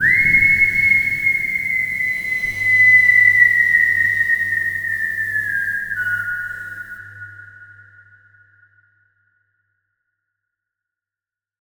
Speech > Other
Whistling in Deep Cathedral
A long whistle in an abandoned church corridor. Recorded with a Tascam DR-05
abandoned, ambience, ambient, cathedral, church, creepy, dark, echo, echoes, fx, hall, hum, humming, long, pitch, reverb, reverberation, sfx, speech, vocals, whistle, whistling, wind, windy